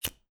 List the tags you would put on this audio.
Sound effects > Objects / House appliances
flame
light

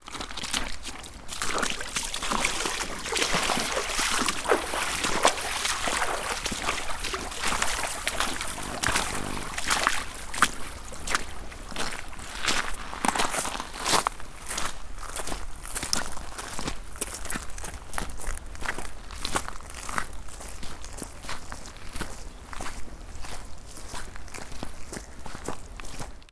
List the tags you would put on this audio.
Soundscapes > Nature
Redwood
Ambience
River
Roomtone
footstep
Nature
Environment
Redwoods
walking
Ocean
slosh
Canyon
Natural
Stream
splash
Water
footsteps
Brook

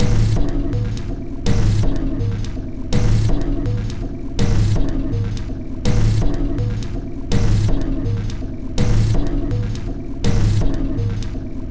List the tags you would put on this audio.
Instrument samples > Percussion
Underground
Loopable
Loop
Packs
Alien
Weird
Industrial
Dark
Samples
Soundtrack
Drum
Ambient

